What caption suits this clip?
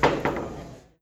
Sound effects > Objects / House appliances
FOLYProp-Samsung Galaxy Smartphone, CU Billiards, Ball, Drop Nicholas Judy TDC
A billiards ball drop.
billiards, Phone-recording, ball, foley